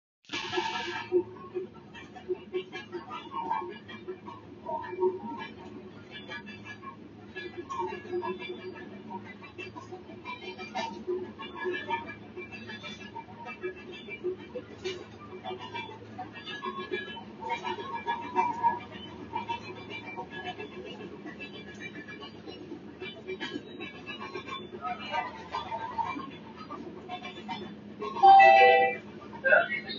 Sound effects > Vehicles
comboio - train
comboio a andar sobre linha, gravado no interior do comboio com aviso de chegada a paragem (areia-darque) #0:29. train moving on the tracks, recorded inside the train with notice of arrival at the stop (areia-darque) #0:29. Recorded on Wednesday, April 24th, around 16:00 pm in Areia-Darque, specifically arriving at the train stop. Recorded inside of the train, with cellphone microphone.
ambiance, field-recording, vehicles